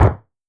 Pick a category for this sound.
Sound effects > Human sounds and actions